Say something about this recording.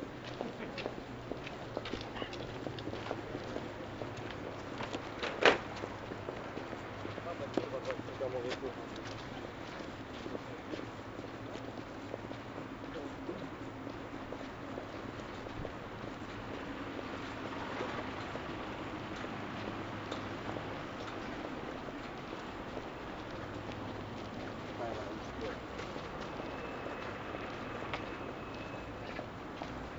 Soundscapes > Urban
Old recording, made probably with phone, during my 2015 January work commute. Part 8: Gdańsk Open Street Part of my walk to work. You can hear heavy traffic, crosswalk melody, sometimes chatter.